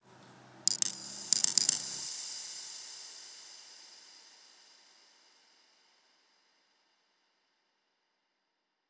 Sound effects > Other
My own sound clicking effect with some reverb and delay
guess2, guess1, guess